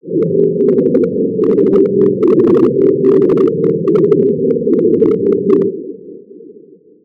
Sound effects > Electronic / Design
some synthwave/cyberpunk style sound design this morning